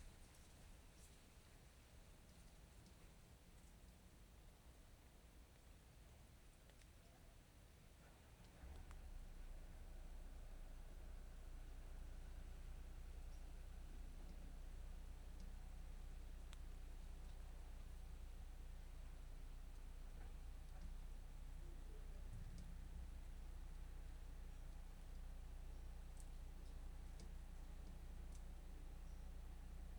Soundscapes > Urban
20250906 0652 Gergueil mic on Est gutter of Church
Subject : Gergueil's church bells and ambience. Date YMD : 2025 September 06, 06h52 Location : Gergueil 21410 Bourgogne-Franche-Comté Côte-d'Or France. Hardware : DJI Mic 3 magnetically mounted on a side gutter on the east of church. Weather : Not far from raining, maybe even did when I got the mic. Processing : Trimmed and normalised in Audacity. Notes : The "noise" (as in white noise) you hear with the bells isn't saturation, it's some kind of rope mechanism / motor pulling the bells from the inside.
2025, 21410, bell, bells, Bourgogne-Franche-Comte, Chuch, church, Cote-dor, country-side, ding, DJI, DJI-mic3, field-recording, France, Gergueil, light-rain, Mic3, Morning, ring, ringing, September, village